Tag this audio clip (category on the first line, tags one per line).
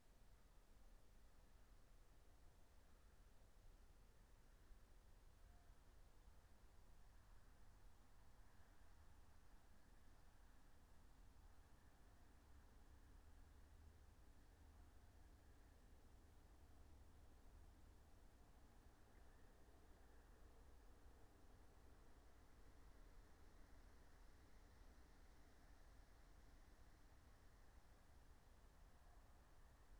Soundscapes > Nature
field-recording
alice-holt-forest
meadow
soundscape
nature
natural-soundscape
phenological-recording
raspberry-pi